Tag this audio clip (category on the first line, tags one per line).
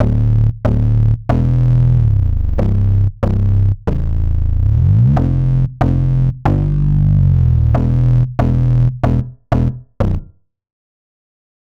Music > Solo instrument
bass
distorted
hard
Sample
synth